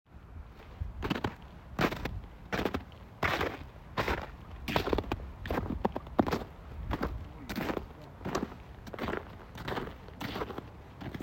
Sound effects > Human sounds and actions
Snow Walk
Boots walking through both icey and soft snow.
snow, walk, walking